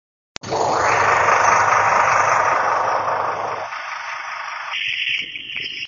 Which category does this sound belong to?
Soundscapes > Urban